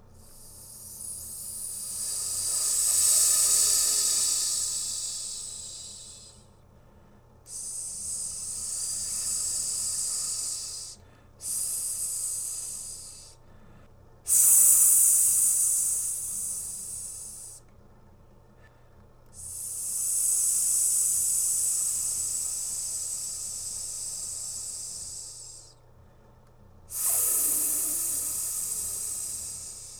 Sound effects > Animals
ANMLRept-Blue Snowball Microphone Snake Hiss, Simulated, Vocal Nicholas Judy TDC
A snake hissing simulated using a human voice. Also for realistic snake sounds.
human, Blue-brand, voice, simulated, hiss, Blue-Snowball, snake